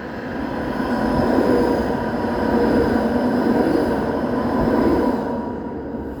Sound effects > Vehicles
Tram passing by at moderate speed (30 kph zone) on a track embedded in asphalt. Recorded in Tampere, Finland, in December 2025 in a wet weather with mild wind. May contain slight background noises from wind, my clothes and surrounding city. Recorded using a Samsung Galaxy A52s 5G. Recorded for a university course project.